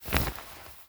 Sound effects > Objects / House appliances
cloth-foley-1
Cloth, clothes, clothing, fabric, Foley, Jacket, movement, Rustle